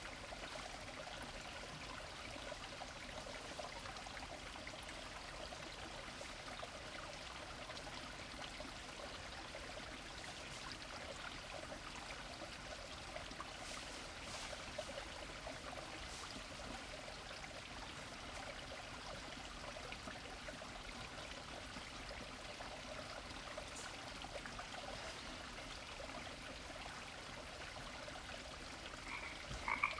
Sound effects > Animals
The stream in El Prieto Canyon near Altadena, California, USA. the night of February 26, 2020. recorded with the Zoom H1 onboard mics. Begins with the frogs silent, then they build up.
forest, frogs, night, stream